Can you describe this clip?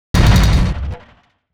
Sound effects > Other
Sound Design Elements Impact SFX PS 073
cinematic, strike, transient, percussive, shockwave, rumble, hit, sfx, design, crash, sound, explosion, collision, effects, heavy, power, blunt, force, impact, audio, sharp, thudbang, game, hard, smash